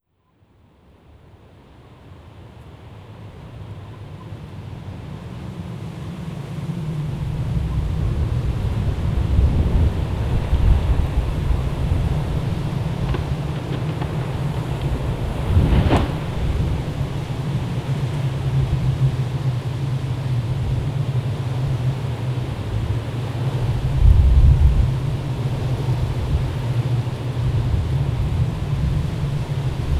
Sound effects > Natural elements and explosions
Wind howling over Reservoir No 2
Storm Henk recorded at the Walthamstow Wetlands reservoirs in January 2024. 57mph wind gusts over large open expanses of water in north east London. Mono recording with a Zoom H5 with a Rode NTG-1. Basic deadcat wind protection, with the microphone pointed away from the wind, shielded by my body. Limited processing including some mild compression of wind distortion; slight boost to LF and HF; normalised to -1dB.
field-recording, storm, water, weather, wind